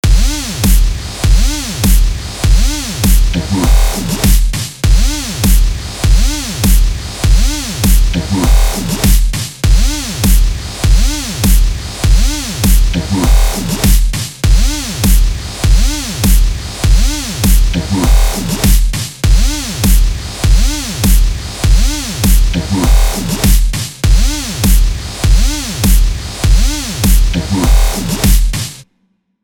Music > Multiple instruments
Musical Composition
A simple composition I made with massive,nexus. This composition is fantastic. Ableton live.